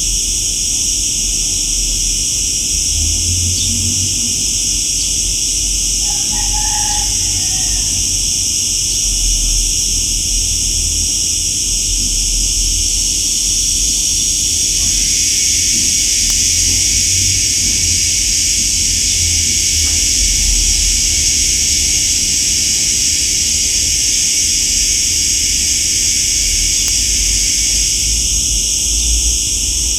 Soundscapes > Nature
quick phone recording at the courtyard of a house in main town Rabat/Victoria, Gozo, Malta (2025-07-02_09-45-09)